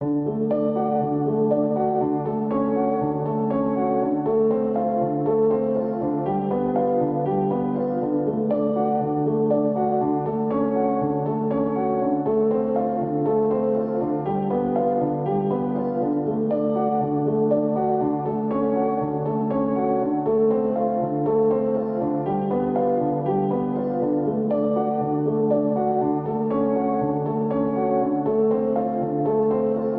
Music > Solo instrument
Piano loops 035 efect 4 octave long loop 120 bpm
120, 120bpm, free, loop, music, piano, pianomusic, reverb, samples, simple, simplesamples